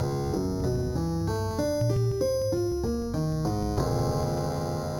Music > Other
Unpiano Sounds 009
Distorted, Distorted-Piano, Piano